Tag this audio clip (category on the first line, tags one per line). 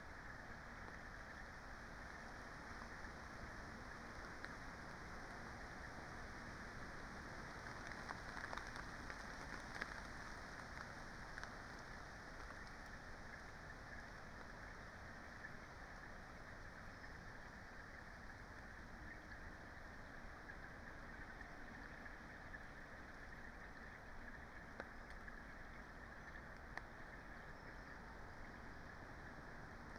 Soundscapes > Nature
weather-data
modified-soundscape
soundscape
natural-soundscape
field-recording
nature
sound-installation
phenological-recording
raspberry-pi
alice-holt-forest
Dendrophone
artistic-intervention